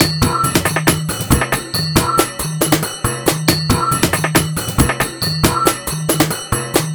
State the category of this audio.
Music > Other